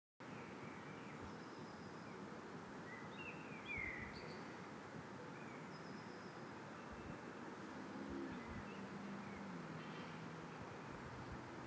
Soundscapes > Nature
Forest ambience 01
Stereo recording of forest with birds and cars in the background.